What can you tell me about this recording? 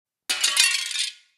Sound effects > Other
Glass Crash
break, shatter, smash, breaking, glass, crash